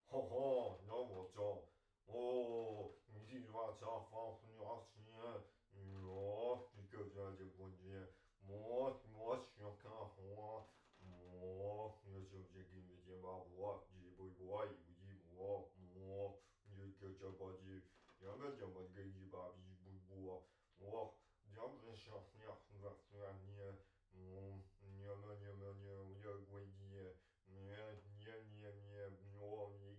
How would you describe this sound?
Speech > Other
Mumbo Jumbo 4
FR-AV2, indoor, male, Mumble, mumbling, mumbo, NT5, Rode, solo-crowd, Tascam, unintelligible, XY